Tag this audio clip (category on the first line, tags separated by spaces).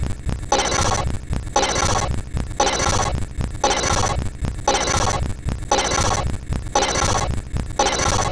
Instrument samples > Percussion
Loopable Alien Ambient Dark Industrial Drum Underground Soundtrack Packs Samples Weird Loop